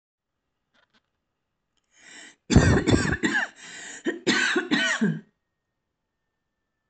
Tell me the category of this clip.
Soundscapes > Other